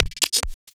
Sound effects > Experimental
Glitch Percs 14 perkroll
percussion, glitch, glitchy, alien, clap, impact, snap, zap, crack, idm, experimental, fx, whizz, lazer, sfx, impacts, abstract, laser, hiphop, perc, pop, edm, otherworldy